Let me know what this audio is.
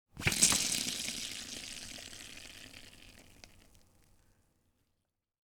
Sound effects > Objects / House appliances
Liquid Squeeze
Sound of me squeezing a half-open can.
ooze, container